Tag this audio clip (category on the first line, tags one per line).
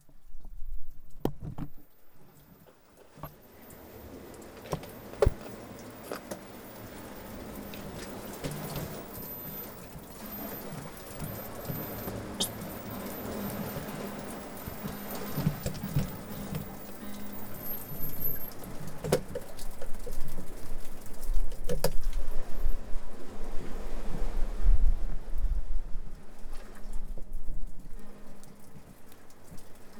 Soundscapes > Nature
storm
sea
weather
nature
rocks
field-recording
beach
water
rain